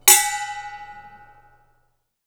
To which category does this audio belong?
Sound effects > Objects / House appliances